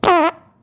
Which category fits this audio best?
Sound effects > Other